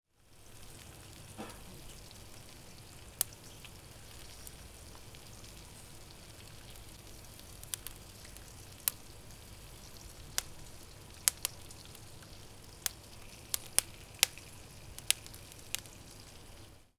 Sound effects > Natural elements and explosions
Carbón de asado - Barbeque charcoal
Ambient sounds from a traditional paraguayan barbeque, burning charcoal.